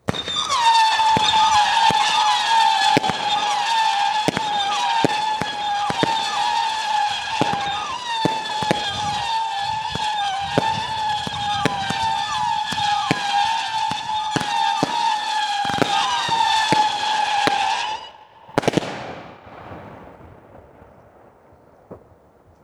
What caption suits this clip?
Sound effects > Natural elements and explosions
Howling Fireworks
Howling, whining fireworks swarm onslaught, sounding a bit like rocket bombing attack or shelling strike, at least that's what my mind (raised on video games) tells me. Likely illegal firework haul by some group at 4AM on Jan 1st 2026 in a Berlin park recorded via Motorola Moto G34 5G. Less windy track extracted, normalized and sliced.